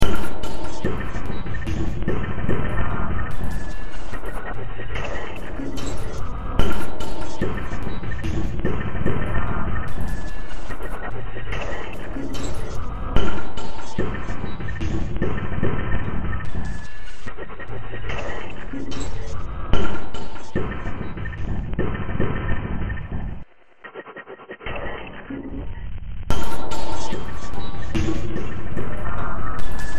Music > Multiple instruments
Demo Track #3581 (Industraumatic)
Games, Noise, Soundtrack